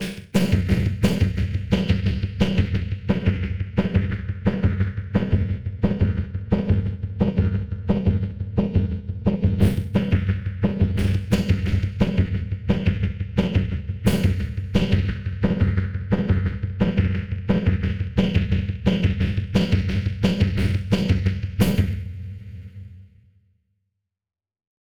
Instrument samples > Percussion
Simple Bass Drum and Snare Pattern with Weirdness Added 010
Silly, FX-Drums, Experimental-Production, FX-Drum, Fun, FX-Laden, Bass-and-Snare, Experimental, Simple-Drum-Pattern, Glitchy, Interesting-Results, Four-Over-Four-Pattern, Experiments-on-Drum-Beats, Experiments-on-Drum-Patterns, FX-Laden-Simple-Drum-Pattern, FX-Drum-Pattern, Snare-Drum, Noisy, Bass-Drum